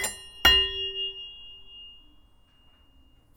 Sound effects > Other mechanisms, engines, machines
metal shop foley -058
bam, bang, boom, bop, crackle, foley, fx, knock, little, metal, oneshot, perc, percussion, pop, rustle, sfx, shop, sound, strike, thud, tink, tools, wood